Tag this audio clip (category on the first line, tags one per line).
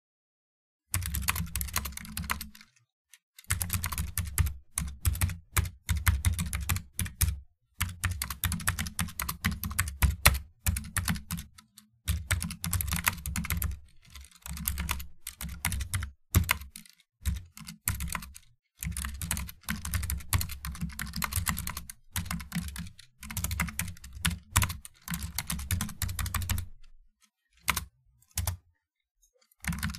Sound effects > Objects / House appliances
computer
typing
laptop
writing
fingers
type
mechanical
keyboard